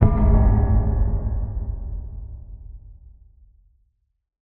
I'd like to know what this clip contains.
Sound effects > Electronic / Design
LOW OBSCURE NETHER IMPACT
HIPHOP, BOOM, IMPACT, BASSY, EXPERIMENTAL, DIFFERENT, RAP, RATTLING, EXPLOSION, INNOVATIVE, UNIQUE, TRAP, LOW, RUMBLING, DEEP, HIT